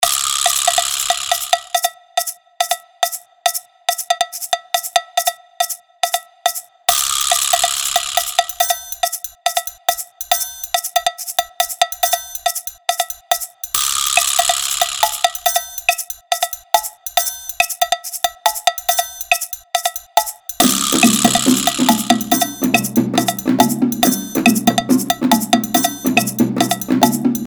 Music > Multiple instruments
Progressive Percussion Loop 140 BPM
A small loop I whipped up in FL Studio using some samples I have. The bongoes where recorded by me, but everything else is a basic preset or free plugin.